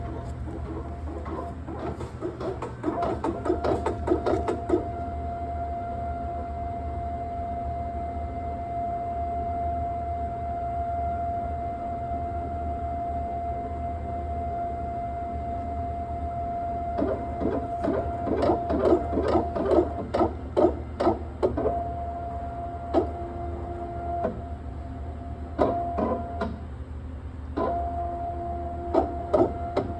Soundscapes > Indoors
Immunoanaylyzer idling noises
Beeping and grinding noises that come from the machine when it is idling. An ELISA VIDAS immunoanalyzer. I took the recording with my iphone 14